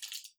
Instrument samples > Percussion
Cellotape Percussion One Shot25
Cellotape Percussion One-Shots A collection of crisp, sticky, and satisfyingly snappy percussion one-shots crafted entirely from the sound of cellotape. Perfect for adding organic texture, foley-inspired rhythm, or experimental character to your beats. Ideal for lo-fi, ambient, glitch, IDM, and beyond. Whether you're layering drums or building a track from scratch, these adhesive sounds stick the landing. 👉 Download now and explore thousands more free, original sounds at SignatureSamples.orgCellotape Percussion One-Shots A collection of crisp, sticky, and satisfyingly snappy percussion one-shots crafted entirely from the sound of cellotape. Perfect for adding organic texture, foley-inspired rhythm, or experimental character to your beats. Ideal for lo-fi, ambient, glitch, IDM, and beyond. Whether you're layering drums or building a track from scratch, these adhesive sounds stick the landing.